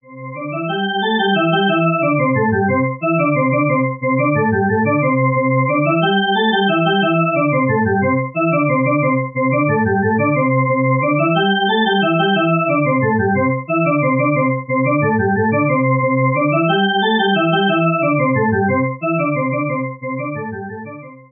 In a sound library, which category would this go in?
Music > Solo instrument